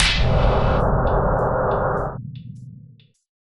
Sound effects > Electronic / Design
Impact Percs with Bass and fx-038
low, crunch, bass, cinamatic, combination, fx, explode, foreboding, brooding, percussion, deep, bash, ominous, looming, impact